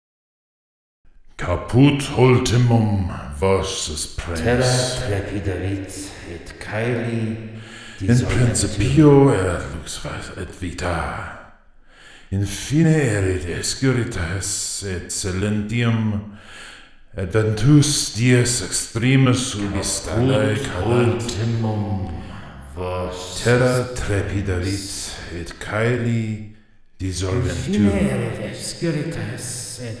Speech > Solo speech
Creepy Latin Speech 5 (multi-layer)
A couple different voices saying random latin words, praying, summoning, spell, chanting. Ends with "amen". Made for a story.
creepy, drama, eerie, ghost, Gothic, haunted, latin, prayer, praying, preist, scary, sinister, spookie, spooky, summoning, thrill